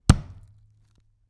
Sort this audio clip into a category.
Sound effects > Experimental